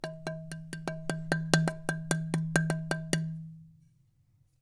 Music > Solo instrument
Marimba Loose Keys Notes Tones and Vibrations 28-001
loose, block, tink, percussion, keys, foley, woodblock, fx, wood, perc